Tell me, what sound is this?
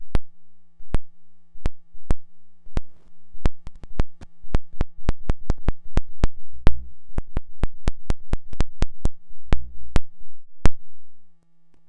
Sound effects > Electronic / Design
Optical Theremin 6 Osc dry-042
Dub, FX, Spacey, Sweep, Synth, Optical, DIY, Noise, Theremin, Alien, Robotic, Glitch, Infiltrator, Sci-fi, Instrument, Handmadeelectronic, Electro, Analog, Theremins, Experimental, noisey, Glitchy, Electronic, SFX, Robot, Digital, Trippy, Otherworldly, Bass, Scifi